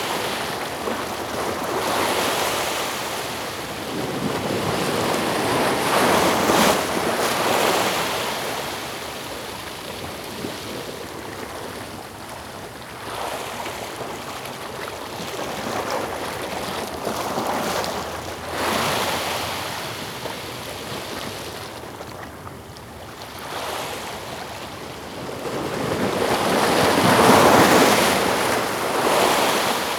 Soundscapes > Nature
Ocean Pier - Churning, Sloshing Waves, Salt Spray
Waves breaking against a concrete pier. Recorded with a Zoom H2n in 4 channel surround mode
beach, breaking, breaking-waves, coast, coastal, crashing, lapping, ocean, pier, relaxing, sea, sea-shore, seashore, seaside, shore, splash, splashing, spray, surf, tide, water, wave, waves